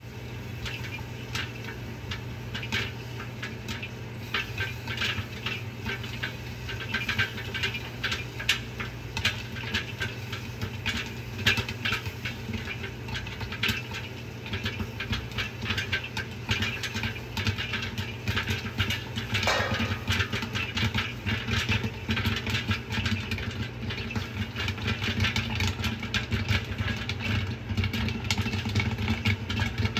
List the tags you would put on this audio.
Sound effects > Other mechanisms, engines, machines
theatre
crackle
hiss
field-recording
pop

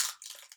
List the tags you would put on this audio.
Instrument samples > Percussion
layering
design
sound
pack
sample
electronic
found
glitch
percussion
tape
experimental
ambient
samples
foley
creative
DIY
one
shot
unique
lo-fi
adhesive
cellotape
cinematic
shots
sounds
IDM
organic
texture
drum

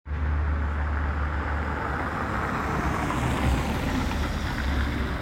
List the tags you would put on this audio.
Sound effects > Vehicles
automobile
vehicle

